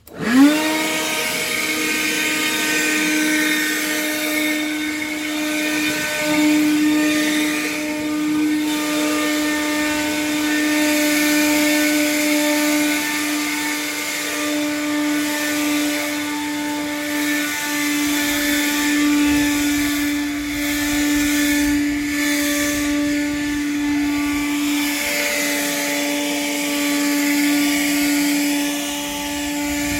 Sound effects > Other mechanisms, engines, machines
TOOLPowr-Samsung Galaxy Smartphone, CU Bauer 20V Leaf Blower, Blowing Sawdust Away Nicholas Judy TDC
A Bauer 20V leaf blower blowing sawdust away. No leaf elements.
away, blow, leaf-blower, Phone-recording, sawdust